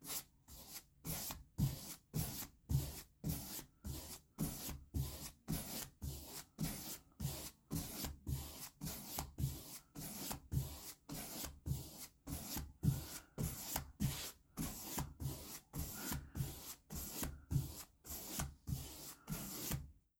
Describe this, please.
Sound effects > Objects / House appliances
OBJMisc-Samsung Galaxy Smartphone, CU Paintbrush, Brushing Nicholas Judy TDC
A paintbrush brushing.
foley, Phone-recording